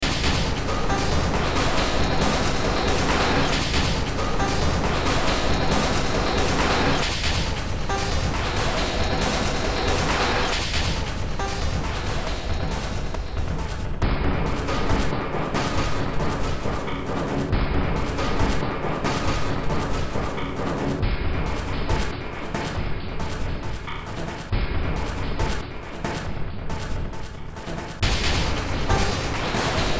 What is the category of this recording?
Music > Multiple instruments